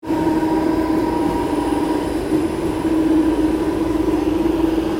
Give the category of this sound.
Sound effects > Vehicles